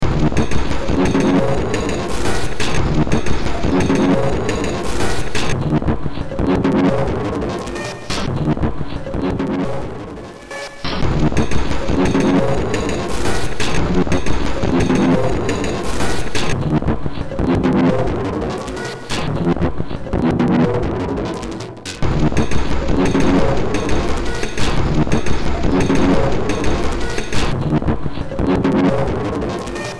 Music > Multiple instruments
Short Track #3466 (Industraumatic)
Cyberpunk, Ambient, Soundtrack, Sci-fi, Noise, Underground, Games, Horror, Industrial